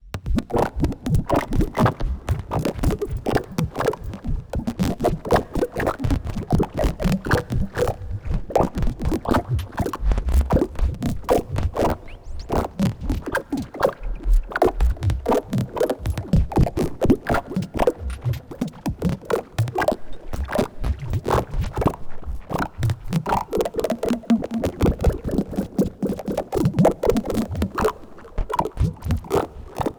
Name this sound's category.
Music > Other